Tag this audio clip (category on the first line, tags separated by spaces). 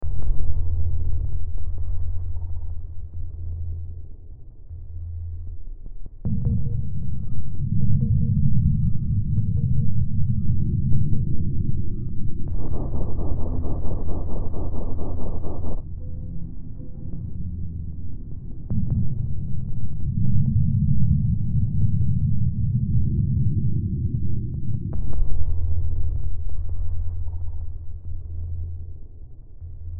Soundscapes > Synthetic / Artificial
Drone Soundtrack Darkness Underground Survival Ambient Hill Noise Weird Games Gothic Silent Ambience Horror Sci-fi